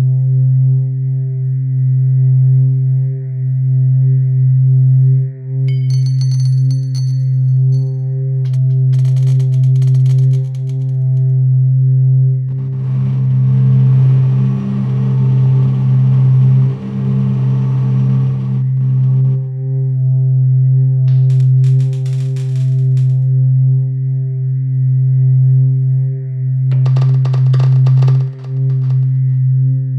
Soundscapes > Synthetic / Artificial
Low Frequency Drone Bass Soundscape with House Objects Glitches
A low frequency drone bass soundscape. There is an oscillating low frequency tone done with miRack which is generating the drone ambient effect. On top of that, here and there, recordings of sounds of my house pop up (stairs, doors, paper, etc). The house sounds were recorded on koala and the two things put together with AUM on iPad